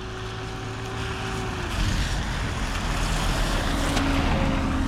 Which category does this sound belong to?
Sound effects > Vehicles